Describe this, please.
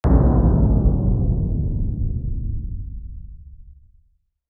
Sound effects > Electronic / Design
Power Down 2
all-stop, computer-power-down, deactivate, deactivate-machine, decativate-shield, engine-deactivate, hark-a-liar, machine-deactivate, machine-off, machine-power-down, machine-shut-down, power-down, power-down-machine, powering-down, power-off, power-outage, shut-down, slow, slow-down, turn-off, turn-off-machine, warp-drive-deactivate